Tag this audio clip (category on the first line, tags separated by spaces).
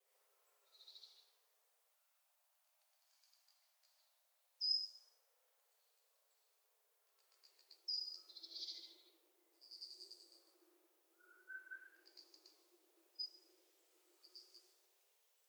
Sound effects > Animals
autumn birdsong isolated birds